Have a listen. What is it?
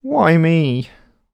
Speech > Solo speech
Sadness - Whyy mee
dialogue, Human, Voice-acting